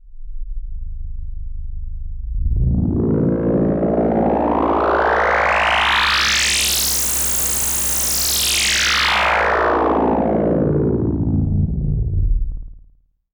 Sound effects > Experimental
Analog Bass, Sweeps, and FX-045
synth, trippy, analogue, bassy, snythesizer, oneshot, effect, sweep, electro, machine, alien, sci-fi, bass, weird, robotic, vintage, retro, korg, analog, sample, robot, sfx, scifi, pad, basses, electronic, fx, mechanical, complex, dark